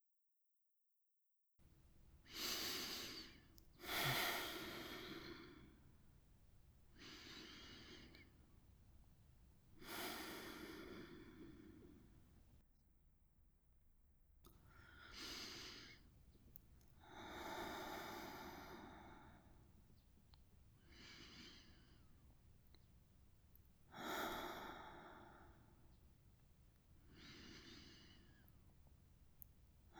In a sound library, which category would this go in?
Sound effects > Human sounds and actions